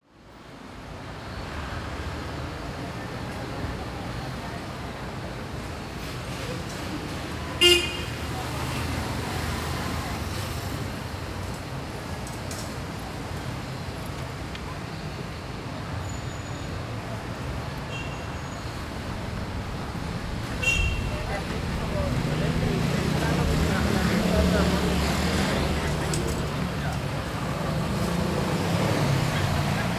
Soundscapes > Urban
Street sounds in Cali Colombia - vendors, motorcycles and people. Recorded with "Shure MV88+", Date: 2024-11-21 09:36
field-recording, language, people, Spanish, street, traffic